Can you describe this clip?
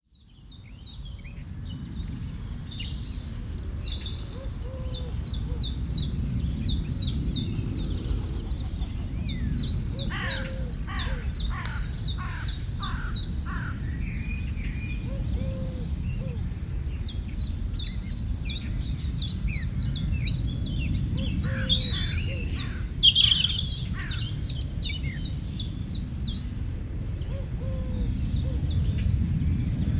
Soundscapes > Urban
A recording on a canal.
birds, train, Field, ambience, traffic, residential, recording